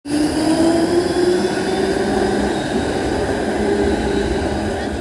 Sound effects > Vehicles
tram sunny 02
motor, sunny, tram